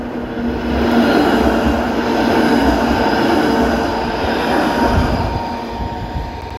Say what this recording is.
Soundscapes > Urban
ratikka12 copy
traffic, tram, vehicle